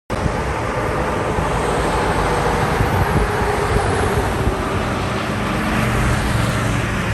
Sound effects > Vehicles
Sun Dec 21 2025 (22)
highway, truck